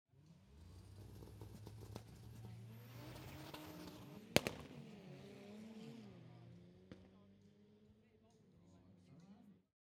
Soundscapes > Other
Wildcards Drag Race 2025
Left: DPA 4055 Kick-Drum Microphone Right: AUDIX D6 Portable audio recorder: Sound Devices MixPre-6 II Sounds like a gunshot, but it is actually from the same race event, where cars make this kind of noise when pushed to the limit for better acceleration. Only minimal processing applied: Reaper: Item -> Item processing -> Explode multichannel audio or MIDI to new one-channel items Pro Tools: REQ 2; Pro-Q 4; L2; Free Pan; Free Meter Wildcards Drag Race, which took place on August 17, 2025, in Estonia, on the grounds of the former military airfield in Klitsi. I carried out several tests with different microphones and various setups. This particular clip was made using one specific configuration.
Race, Drag